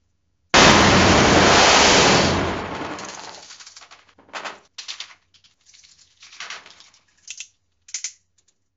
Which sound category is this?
Sound effects > Objects / House appliances